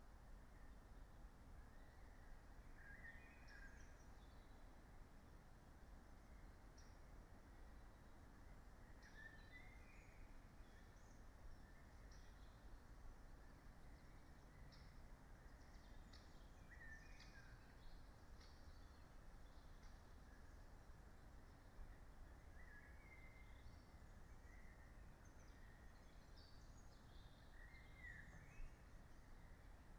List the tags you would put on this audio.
Soundscapes > Nature
field-recording alice-holt-forest phenological-recording artistic-intervention natural-soundscape soundscape nature modified-soundscape Dendrophone raspberry-pi data-to-sound sound-installation weather-data

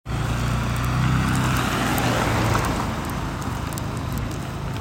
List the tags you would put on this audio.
Sound effects > Vehicles
tampere field-recording car

